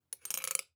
Sound effects > Other mechanisms, engines, machines
Metal Scrape 01
metal, scrape, noise, sample